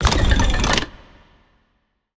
Sound effects > Other mechanisms, engines, machines
Complex Mechanism Medium
Sound of moving/aligning a crane/mechanical arm created for a video game. The crane action sound got changed to an UI sound so I can share this one free. Created from organic recordings of gears, mechanical gizmos and industrial sounds.